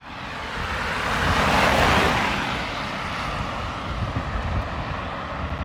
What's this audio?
Soundscapes > Urban
Car passing Recording 41
Road, vehicle, Cars